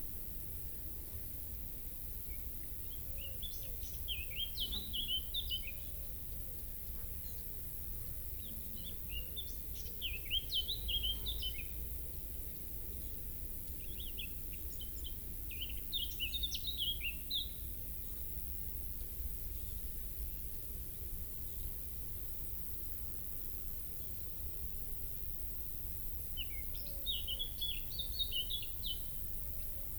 Soundscapes > Nature
Summer meadow - Houghton Lodge
The recorder was placed in the long light brown grass and you can hear insects chirping, a bird occasionally singing and a couple of fat pigeons fly over. About a minute in, the insects slow down with the noise and a gentle breeze runs over the recorder. It was hot, about 25 degrees C and very peaceful. Zoom H1e - clippy mics, slight low-cut.
ambience, birds, breeze, dry, field, field-recording, gardens, heatwave, hot, houghton, insects, lodge, meadow, nature, relaxing, summer, uk